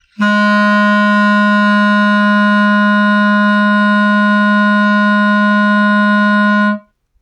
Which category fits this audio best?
Instrument samples > Wind